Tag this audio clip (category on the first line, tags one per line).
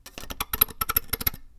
Sound effects > Other mechanisms, engines, machines
crackle
metal
bam
tools
foley
tink
boom
shop
strike
sfx
bang
wood
bop
little
pop
fx
percussion
knock
oneshot
rustle
sound
perc
thud